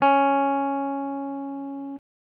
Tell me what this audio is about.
Instrument samples > String
Random guitar notes 001 CIS4 07
electricguitar, stratocaster, electric, guitar